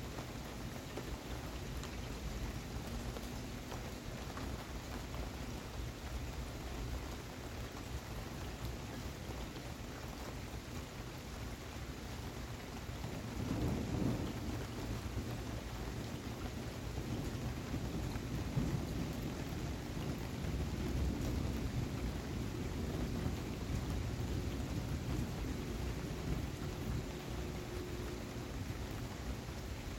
Soundscapes > Nature
STORM-Samsung Galaxy Smartphone Heavy Rain, Rumbling Thunder, Two Loud Rumbles Nicholas Judy TDC
Heavy rain and thunder rumble. Two loud rumbles.
rain, loud